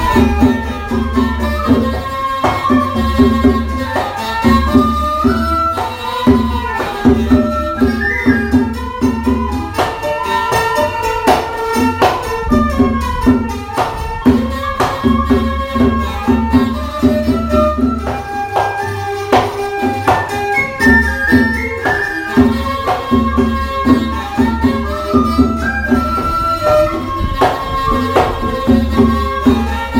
Soundscapes > Urban
Mohori School Music, Kampot, Cambodia (May 10, 2019)
Live recording of Mohori music played at a school in Kampot, Cambodia. Traditional instruments and ensemble performance in a learning context.
Kampot, music, ensemble, Cambodia, instruments, Mohori, education, traditional, performance, school